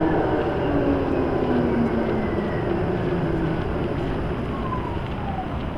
Sound effects > Vehicles
Tram00050160TramArriving
Tram arriving to a nearby stop. Recorded during the winter in an urban environment. Recorded at Tampere, Hervanta. The recording was done using the Rode VideoMic.
tram, winter, transportation, vehicle, city